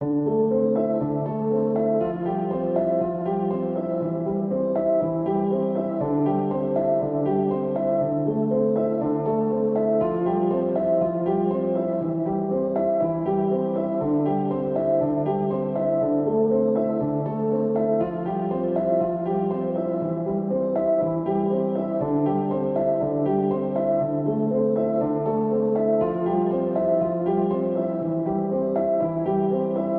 Music > Solo instrument
Piano loops 072 efect 4 octave long loop 120 bpm
120bpm, pianomusic, loop, piano, samples, music, 120, simple, simplesamples, free, reverb